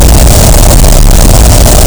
Sound effects > Experimental
Noise from hell
Just amplifying the living shit out of silence. And a few EQ. I must be somewhere like 400db over 0 amplification. Recorded from a Neumann U67 with a Tascam Fr-AV2, not that it matters at this point lol.